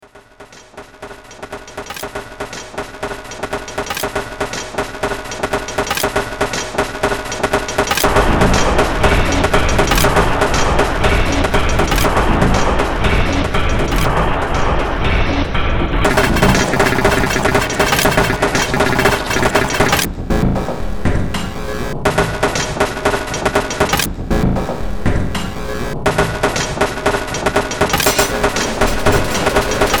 Music > Multiple instruments
Demo Track #3746 (Industraumatic)
Ambient, Cyberpunk, Games, Horror, Industrial, Noise, Sci-fi, Soundtrack, Underground